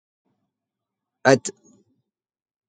Sound effects > Other
ta-sisme
arabic, male, voice